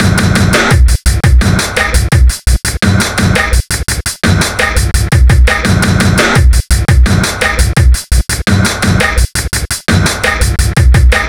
Music > Other
drumloop 170 bpm break
FL studio 9 . vst slicex décomposition du sample
drums, break, beat, IDM, breakbeat, drumloop, loop, drumbeat